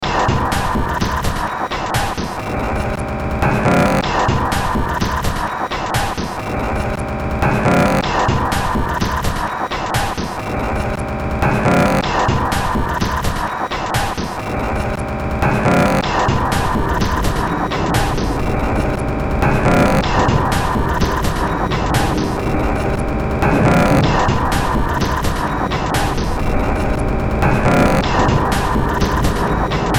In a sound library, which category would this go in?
Music > Multiple instruments